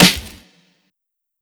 Instrument samples > Percussion
Hip Hop Samples